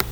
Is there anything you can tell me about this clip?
Sound effects > Other
me flicking my microphone. its gonna die soon, and then i can record more glitch sounds!! (sometimes the mic made disturbing sounds lol)